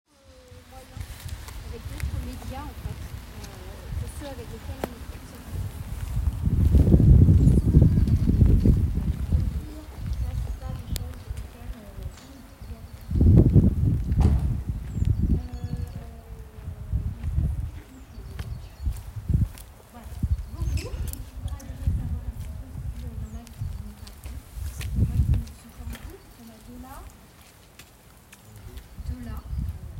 Sound effects > Human sounds and actions
9 Boulevard Montrose
Urban noise, caused by traffic and human activity, disrupts daily life and affects both residents and local wildlife. It reduces the overall sense of peace in the environment.
Marseille, Urbanisation